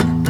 Music > Solo instrument
Acoustic Guitar Oneshot Slice 63
strings, string, guitar, plucked, acoustic, notes, foley, knock, fx, sfx, pluck, twang, oneshot, note, chord